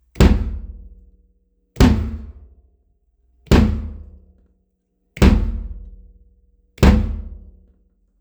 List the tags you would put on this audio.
Instrument samples > Percussion
garbage percussive drum drum-loop loop hihats percs sticks percussion-loop hit snare percussion hh drums improvised samples acoustic groovy solo

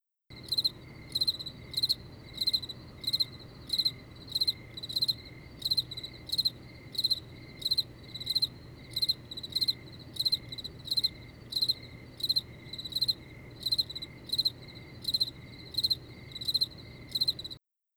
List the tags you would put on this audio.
Sound effects > Animals
Colorado H1n Field-Recording

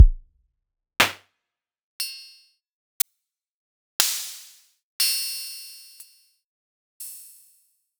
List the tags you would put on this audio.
Instrument samples > Percussion
cowbell drum hihat kick kit percussion rimshot snare synth thwack tom woodblock

